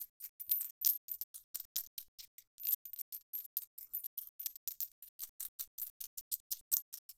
Sound effects > Objects / House appliances
Some coins being tossed in my hands, chopped down to small clips